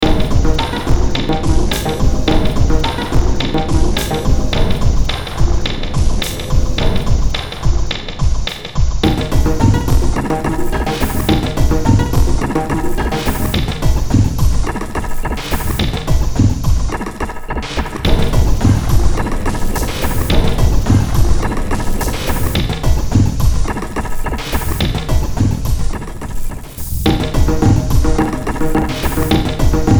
Music > Multiple instruments
Short Track #3588 (Industraumatic)
Ambient, Cyberpunk, Games, Horror, Industrial, Noise, Sci-fi, Soundtrack, Underground